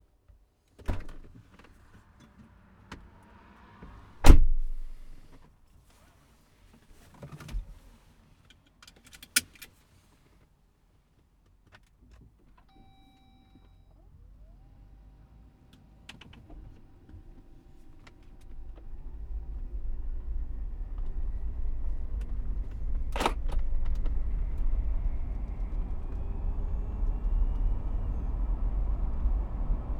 Sound effects > Vehicles
Jeep-4xe-Highway-Binaural-F2025
Door open & close, click of the seatbelt, car starting, door locks, car drives on highway. Recorded with a binaural microphone and a Zoom H6.
jeep, starting, highway, door, hybrid, driving